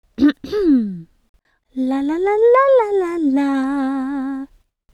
Sound effects > Human sounds and actions
The act of clearing throat, then singing lalalalalalala